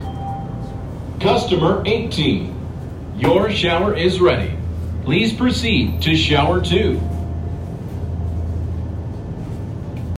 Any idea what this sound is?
Sound effects > Human sounds and actions
"Your Shower Is Ready" intercom voice at Truck Stop
Sound of pre-recorded voice at truck stop, advising customer that their "shower is ready". Recorded at Love’s in Providence Forge, Virginia.
gas-station, intercom, petrol, pre-recorded, rest-stop, shower, truck-stop, voice